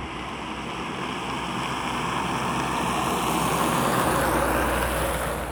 Soundscapes > Urban
voice 8 14-11-2025 car
Car, vehicle